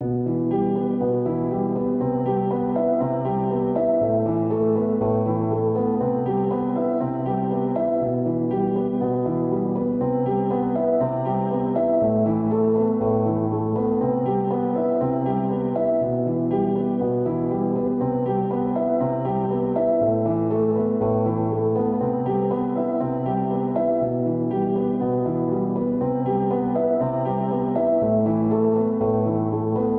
Music > Solo instrument
Piano loops 032 efect 4 octave long loop 120 bpm
120, reverb, simple, music, pianomusic, simplesamples, 120bpm, loop, free, samples, piano